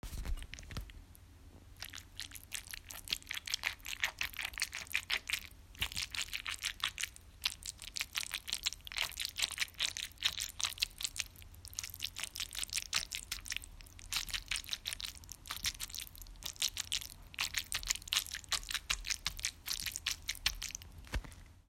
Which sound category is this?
Sound effects > Other